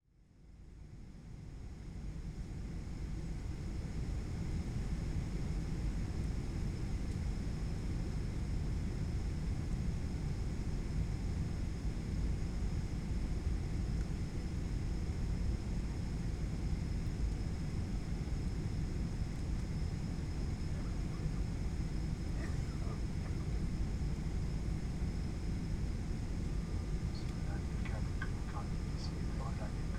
Soundscapes > Other
250829 022244 SA Airplane landing Binaural
Airplane landing (binaural, please use headset for 3D effects). I made this binaural recording while sitting almost in the back of an airplane ready for landing. First, one can hear the airplane flying slowly while some passengers are caughing, and the pilot’s announcement for landing at #00:26. Then, the plane will continue its way, and will land at #9:21. The recording continues until the aircraft slows down. Recorded in August 2025 with a Zoom H5studio and Ohrwurm 3D binaural microphones. Fade in/out and high pass filter at 60Hz -6dB/oct applied in Audacity. (If you want to use this sound as a mono audio file, you may have to delete one channel to avoid phase issues).
aircraft, airplane, ambience, announcement, atmosphere, binaural, engine, field-recording, flight, fly, flying, jet, landing, noise, passengers, people, plane, Saudi-Arabia, soundscape, travel, travelling, trip, voices